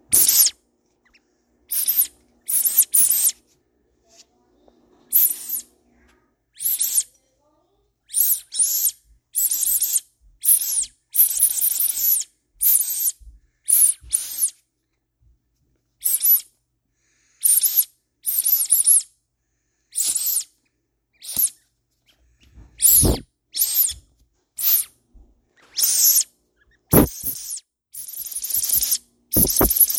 Sound effects > Objects / House appliances
TOYMisc-CU Squeaky Toy Block Nicholas Judy TDC
A squeaky toy block.